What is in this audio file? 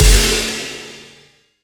Instrument samples > Percussion
crashrich XWR 14
Old crashfiles low-pitched, merged and shrunk in length.
Avedis, multicrash, Paiste, spock, Stagg, Sabian, clash, shimmer, crash, clang, metallic, crunch, multi-China, China, Meinl, Soultone, crack, bang, polycrash, metal, Zultan, Istanbul, cymbal, low-pitched, Zildjian, sinocrash, smash, sinocymbal